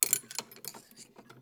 Sound effects > Other mechanisms, engines, machines
Woodshop Foley-059
bam, bang, boom, bop, crackle, foley, fx, knock, little, metal, oneshot, perc, percussion, pop, rustle, sfx, shop, sound, strike, thud, tink, tools, wood